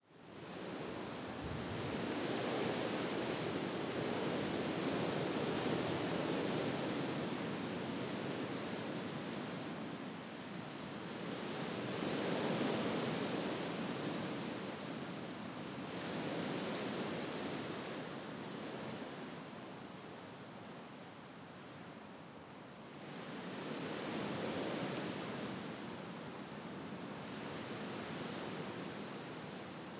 Soundscapes > Nature
gusts on coniferous tree 1
britany, coast, coniferous, field-recording, gale, gust, gusts, pine, trees, wind, windy